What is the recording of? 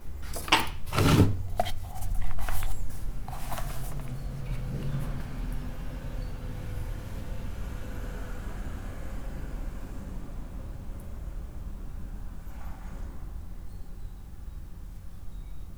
Sound effects > Other mechanisms, engines, machines
Woodshop Foley-002
bam, bang, boom, bop, crackle, foley, fx, knock, little, metal, oneshot, perc, percussion, pop, rustle, sfx, shop, sound, strike, thud, tink, tools, wood